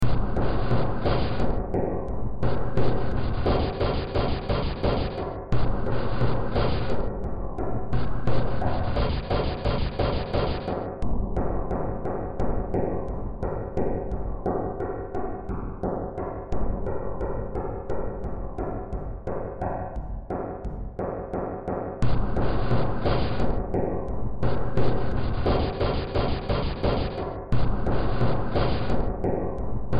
Music > Multiple instruments
Demo Track #3123 (Industraumatic)
Ambient, Soundtrack, Sci-fi, Horror, Games, Underground, Cyberpunk, Noise, Industrial